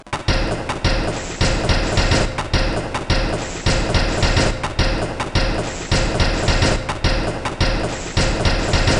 Instrument samples > Percussion
This 213bpm Drum Loop is good for composing Industrial/Electronic/Ambient songs or using as soundtrack to a sci-fi/suspense/horror indie game or short film.
Alien, Ambient, Dark, Drum, Industrial, Loop, Loopable, Packs, Samples, Soundtrack, Underground, Weird